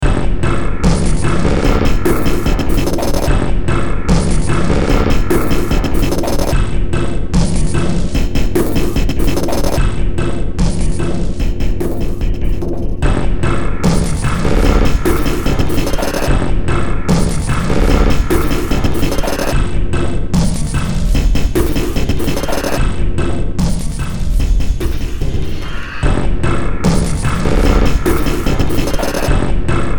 Music > Multiple instruments
Short Track #3445 (Industraumatic)
Cyberpunk, Soundtrack, Games, Sci-fi, Ambient, Noise, Underground, Industrial, Horror